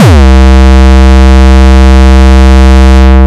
Instrument samples > Percussion
Garbber kick 3 C
Synthed with 3xOsc olny. Processed with Camel crusher, Fruity fast dist, Waveshaper.